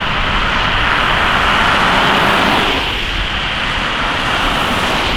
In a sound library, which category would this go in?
Sound effects > Vehicles